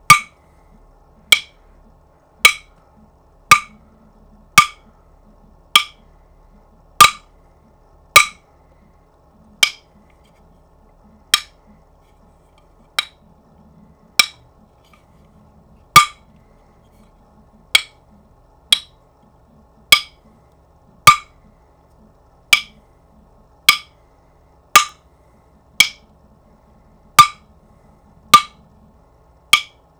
Music > Solo percussion
Wood block hits.